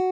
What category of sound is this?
Instrument samples > String